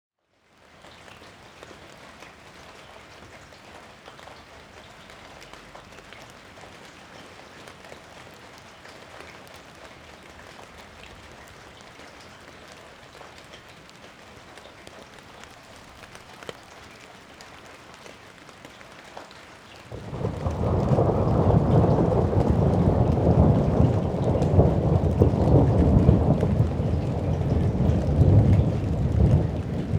Soundscapes > Nature
Recording of rain and thunder. You can hear a moaning and purring cat that passes the microphone. (This is the long version, a shorter version exist too.) Zoom F3 Rode NTG5
animal, cat, moan, moaning, pet, purr, rain, thunder, weather